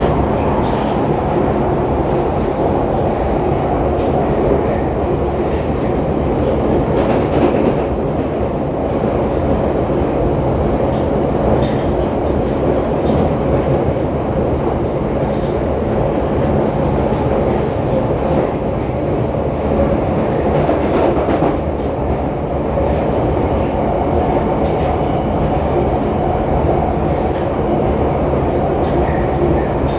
Soundscapes > Urban
London Underground, UK - Piccadilly Line, Turnpike Lane to Finsbury Park
London Underground - Piccadilly Line, Turnpike Lane to Finsbury Park
ambience, announcement, city, engine, line, london, metro, piccadilly, subway, train, transport, travel, tube, tunnel, underground